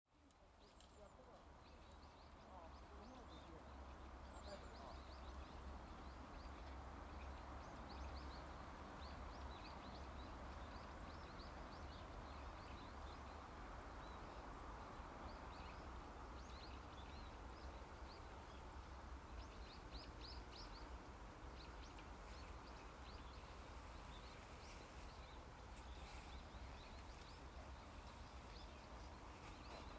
Soundscapes > Nature
Soyu Ji Temple Cemetary - Takayama, Japan
Ambience recording of Soyu Ji Temple cemetary in Takayama, Japan. 16/1/25
ambience, birdsong, cemetary, japan, peaceful